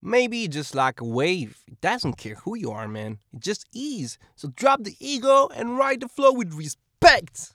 Speech > Solo speech
Surfer dude - drop the ego and ride the flow with respect
Subject : Recording my friend going by OMAT in his van, for a Surfer like voice pack. Date YMD : 2025 August 06 Location : At “Vue de tout Albi” in a van, Albi 81000 Tarn Occitanie France. Shure SM57 with a A2WS windshield. Weather : Sunny and hot, a little windy. Processing : Trimmed, some gain adjustment, tried not to mess too much with it recording to recording. Done inn Audacity. Some fade in/out if a one-shot. Notes : Tips : Script : Maybe it’s just like a wave. It doesn’t care who you are, man. It just is. So drop the ego and ride the flow with respect.
In-vehicle, Surfer, France, August, Tascam, English-language, philosophical-bro, VA, Single-mic-mono, Mono, 2025, Male, Adult, ego, Voice-acting, FR-AV2, sentence, mid-20s, 20s, Dude, Cardioid, RAW, A2WS, SM57